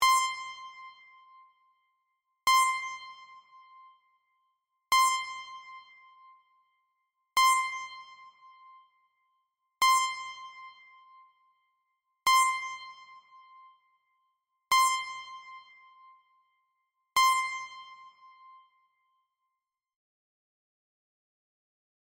Sound effects > Other mechanisms, engines, machines
A hospital beep I made with a synth.